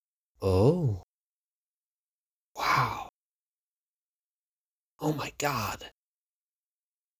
Speech > Solo speech
Oh! Wow!! OMG!!!
Different vocal expressions so simulate a character been suprised at something he sees. Made by R&B Sound Bites if you ever feel like crediting me ever for any of my sounds you use. Good to use for Indie game making or movie making. This will help me know what you like and what to work on. Get Creative!
Awe, Oh, OMG, Suprise, Suprised, Wow